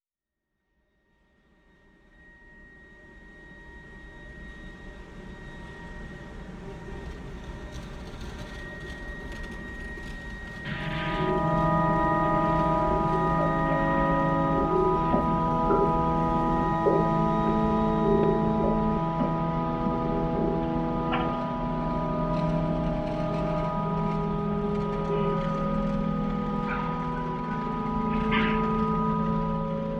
Soundscapes > Synthetic / Artificial
Moody sound collage
A short sound collage featuring field recordings taken in Brooklyn and some synth pads
ambience, melencholy, ambiance, field-recording, atmosphere